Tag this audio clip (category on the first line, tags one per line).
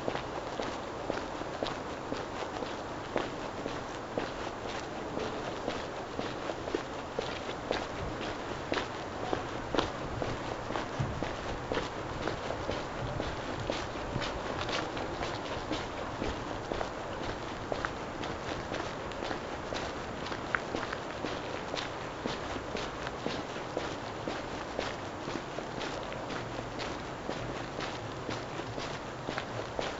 Soundscapes > Urban
footsteps
sidewalk
walking